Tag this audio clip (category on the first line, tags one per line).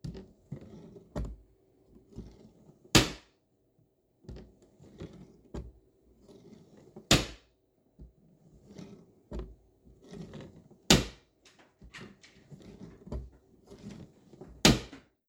Sound effects > Objects / House appliances
close
foley
open